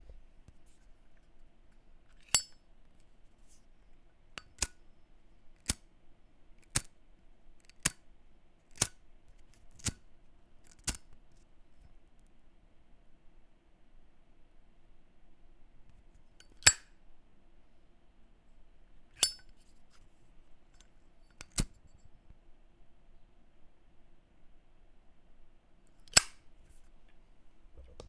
Sound effects > Objects / House appliances
Opening, lighting and closing my zippo lighter. Recorded with the integrated microphones on a Zoom H5, stereo settings.